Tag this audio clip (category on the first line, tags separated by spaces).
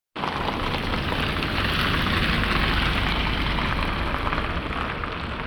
Sound effects > Vehicles
Tampere field-recording Car